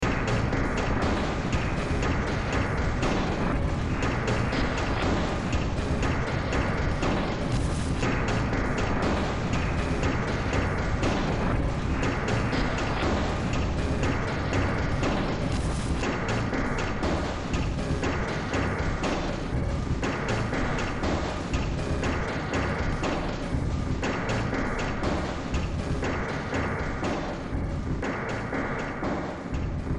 Music > Multiple instruments
Demo Track #2933 (Industraumatic)
Noise, Cyberpunk, Industrial, Horror, Soundtrack, Ambient, Underground, Games, Sci-fi